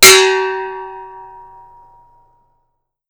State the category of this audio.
Sound effects > Objects / House appliances